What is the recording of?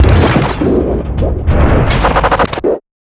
Sound effects > Other mechanisms, engines, machines
Sound Design Elements-Robot mechanism-012
mechanical, mechanism, feedback, actuators, powerenergy, digital, robot, sound, automation, servos, metallic, clanking, robotic, machine, circuitry, design, elements, operation, movement, whirring, clicking, gears, processing, synthetic, hydraulics, grinding, motors